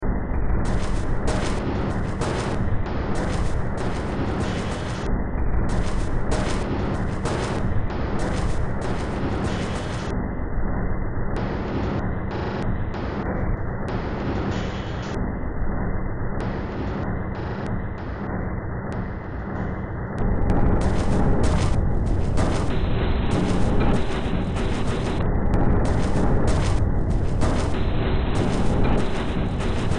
Music > Multiple instruments
Demo Track #3307 (Industraumatic)
Ambient, Cyberpunk, Games, Horror, Industrial, Noise, Sci-fi, Soundtrack, Underground